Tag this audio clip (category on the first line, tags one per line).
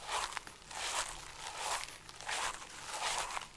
Sound effects > Natural elements and explosions
footsteps
grass
step
steps
walk
walking